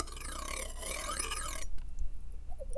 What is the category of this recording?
Sound effects > Objects / House appliances